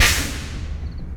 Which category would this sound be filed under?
Instrument samples > Percussion